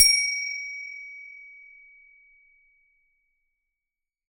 Instrument samples > Percussion
Bell 4.5x5.5cm 2
Subject : A bell 4.5cm wide, 5.5cm tall. Date YMD : 2025 04 21 Location : Gergueil France. Hardware : Tascam FR-AV2 Rode NT5 microphone. Weather : Processing : Trimmed and Normalized in Audacity. Probably some Fade in/outs too.
bell, close-up, cowbell, ding, FR-AV2, NT5, one-shot, oneshot, Rode, swiss-cowbell